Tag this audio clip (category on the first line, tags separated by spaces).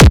Instrument samples > Percussion
BrazilFunk Distorted EDM Kick